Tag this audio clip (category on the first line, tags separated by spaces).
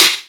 Instrument samples > Percussion
Avedis Avedis-Zildjian bang China clang clash crack crash crunch cymbal Istanbul low-pitchedmetal Meinl metallic multi-China multicrash Paiste polycrash Sabian shimmer sinocrash sinocymbal smash Soultone Stagg Zildjian Zultan